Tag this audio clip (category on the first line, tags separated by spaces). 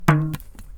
Sound effects > Objects / House appliances
glass metal oneshot